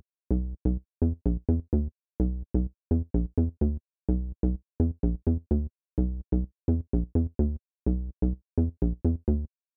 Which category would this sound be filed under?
Music > Solo instrument